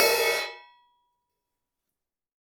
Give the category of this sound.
Music > Solo instrument